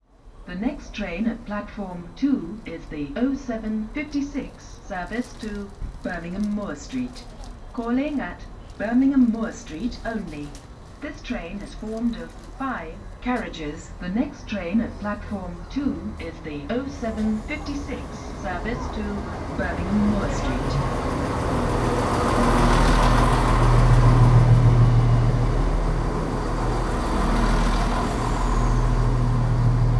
Soundscapes > Urban
A recording at a Train station.
outdoor, train, urban, recording, interior, PA, tannoy, field